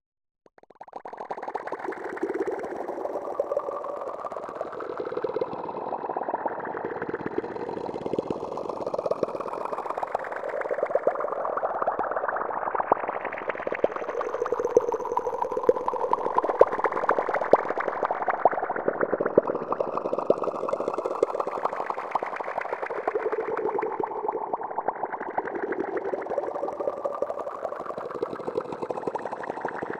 Soundscapes > Synthetic / Artificial
Blip blop reverb and echoes #001
reverb, ipad, blip, echo, blop